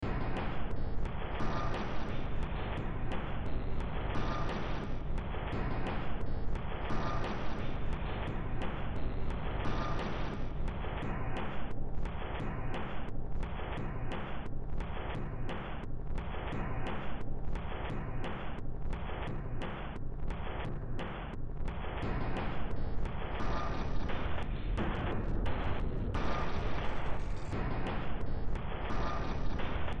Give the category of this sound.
Music > Multiple instruments